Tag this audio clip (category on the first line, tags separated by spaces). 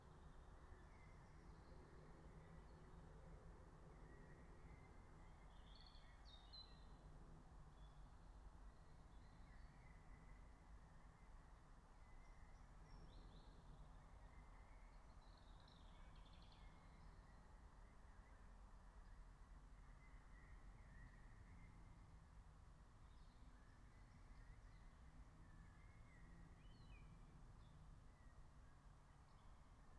Soundscapes > Nature
meadow soundscape alice-holt-forest natural-soundscape raspberry-pi nature field-recording phenological-recording